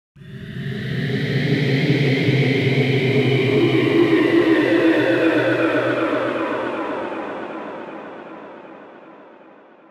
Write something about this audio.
Sound effects > Electronic / Design
Optical Theremin 6 Osc ball infiltrated-009
Glitch, Dub, Spacey, Alien, Electro, Bass, Experimental, noisey, Analog, FX, Robot, Sci-fi, Handmadeelectronic, SFX, Noise, Scifi, Sweep, DIY, Theremin, Robotic, Instrument, Infiltrator, Electronic, Otherworldly, Theremins, Synth, Trippy, Optical, Digital, Glitchy